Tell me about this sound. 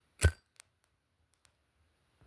Sound effects > Objects / House appliances
Zippo lighter ignite
A zippo lighter being ignited
ignite, lighter, Zippo